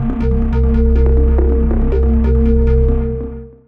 Instrument samples > Synths / Electronic
CVLT BASS 122
bass, bassdrop, clear, drops, lfo, low, lowend, stabs, sub, subbass, subs, subwoofer, synth, synthbass, wavetable, wobble